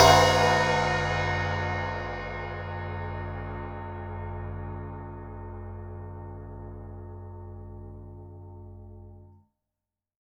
Music > Solo instrument
Zildjian 16 inch Crash-003
Cymbals, Crash, Cymbal, Drums, Kit, Zildjian, Oneshot, Drum, Metal, Custom, Percussion, Perc, 16inch